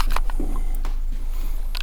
Sound effects > Objects / House appliances
cap foley 4
jostling caps around recorded with tasam field recorder
metal, small, taps, cap, tink, sfx, tap, foley, delicate